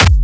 Instrument samples > Percussion
BrazilFunk Kick 12 Max Loundness
Brazilian, BrazilianFunk, Distorted, Kick